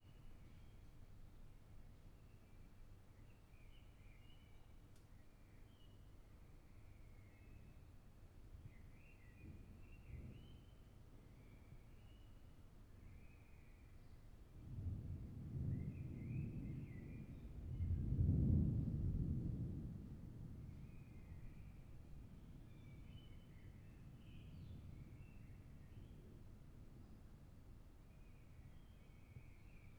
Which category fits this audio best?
Soundscapes > Nature